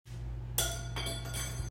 Sound effects > Objects / House appliances
This is metal falling
Metal, Impact, Bang